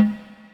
Music > Solo percussion
Snare Processed - Oneshot 139 - 14 by 6.5 inch Brass Ludwig
snareroll, hits, acoustic, snare, perc, flam, kit, ludwig, oneshot, realdrums, snaredrum, sfx, beat, snares, hit, drumkit, realdrum, brass, crack, percussion, rim, drum, fx, rimshot, rimshots, roll, reverb, drums, processed